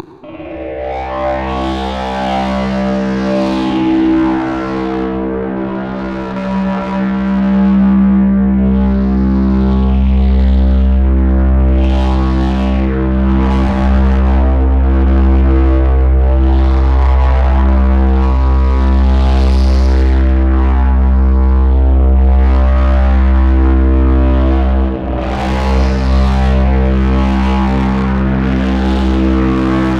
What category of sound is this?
Soundscapes > Synthetic / Artificial